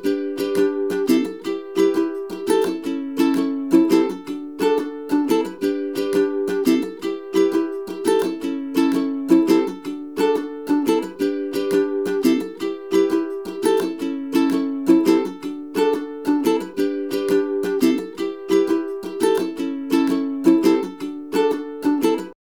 Music > Solo instrument
A Ukulele Tune
Clean studio recording of an acoustic ukulele performing a short melodic phrase
music, melody, ukulele, tune, upbeat, bright, summer, acoustic, loop, happy, sample, instrumental, jingle, chill